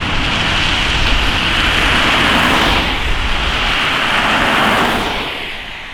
Sound effects > Vehicles
Car00060768CarMultiplePassing
Sound recording of cars driving on a road, with quick succession of multiple cars being heard one after the other. The recording was made on a rainy, winter day. The segment of the road the recording was made at was in an urban environment without crosswalks or streetlights. Recorded at Tampere, Hervanta. The recording was done using the Rode VideoMic.
drive, vehicle, automobile, rainy, car, field-recording